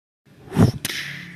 Sound effects > Natural elements and explosions
windy punch
very strong punch
punch, fight, fighting, impact, fist